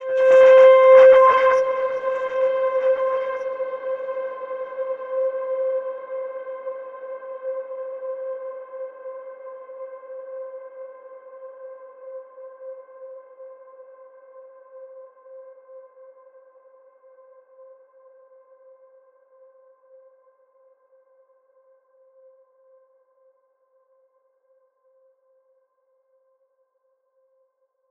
Sound effects > Electronic / Design
An atmospheric soundscape focused on the release of a sound. It begins with a resonant, metallic impact or chime that slowly fades into a long, haunting, and spacious reverb tail, creating a sense of emptiness.
hit, sound-effect, sound-design, impact, electronic, synthetic, texture, fx